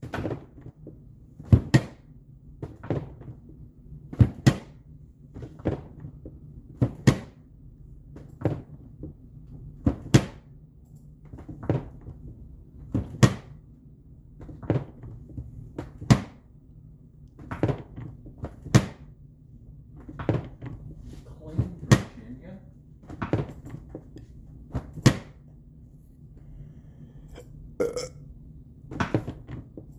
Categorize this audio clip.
Sound effects > Objects / House appliances